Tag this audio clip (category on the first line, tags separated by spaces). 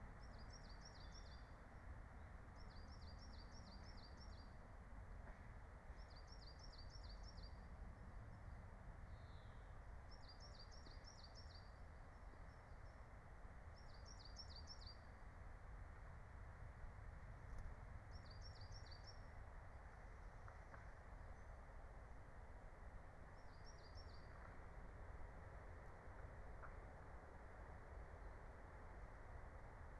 Soundscapes > Nature
field-recording alice-holt-forest phenological-recording meadow